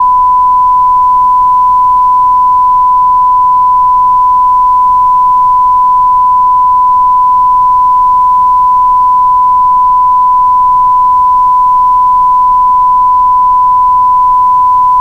Sound effects > Electronic / Design
1000hz ST - noisy
A 1000hz tone, with some noise added. Brownian noise panned 70% left and pink noise panned 70% right. Quick fade in/out to avoid clicking. You could trim this and use it as a censor beep. Made in Audacity using its generators.